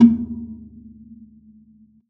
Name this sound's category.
Instrument samples > Percussion